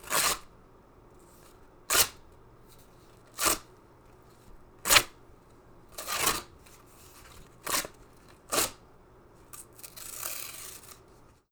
Sound effects > Objects / House appliances
PAPRRip-Blue Snowball Microphone, CU Cardboard, Assorted Nicholas Judy TDC
Assorted cardboard rips.
assorted, foley, Blue-brand, rip, cardboard, Blue-Snowball